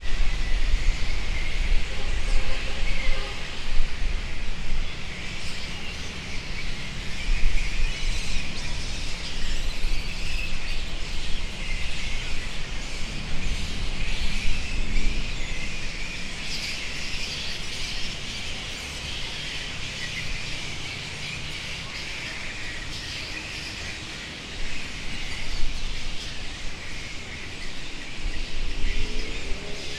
Soundscapes > Urban
250729 180458 PH Hundreds of flying foxes
Hundreds of flying foxes (acerodon jubatus) at Puerto Galera. (take 4) I made this recording at dusk, in Puerto Galera (Oriental Mindoro, Philippines) while hundreds (maybe thousands ?) flying foxes were screaming and flying in the wind. Very impressive ! In the background, one can hear some noise and hum from the town. Recorded in July 2025 with a Zoom H5studio (built-in XY microphones). Fade in/out applied in Audacity.
acerodon-jubatus, ambience, atmosphere, bat, bats, field-recording, flying-fox, flying-foxes, hum, Philippines, Puerto-Galera, scream, screaming, soundscape, suburban, town, wind, windy